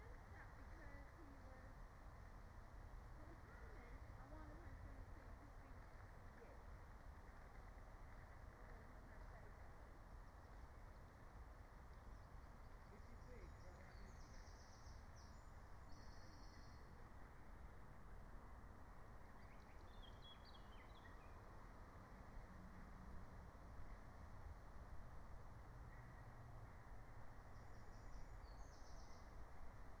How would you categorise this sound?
Soundscapes > Nature